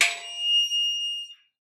Sound effects > Other
A recording of a Metal gate being pushed open. Edited in RX 11.
high, metal, outdoor, pitched